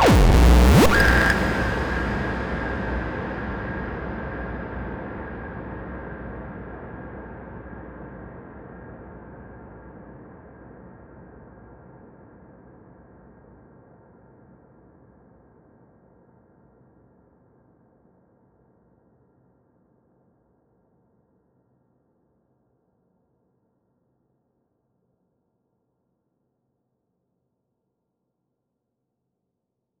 Sound effects > Other mechanisms, engines, machines
sfx3 = Abrupt Alarm-like Sound with Heavy Reverb
I built a Wien Bridge oscillator one day out of idleness and a craving for solder smoke. Then, I recorded it. Some of the transitional moments I found dramatic. I also felt reverb-dramatic, so I added a lot.